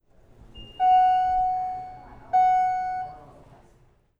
Sound effects > Other mechanisms, engines, machines
the dinging of an elevator